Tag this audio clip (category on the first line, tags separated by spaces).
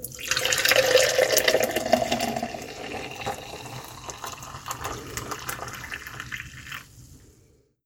Sound effects > Objects / House appliances
coffee foley mug Phone-recording pour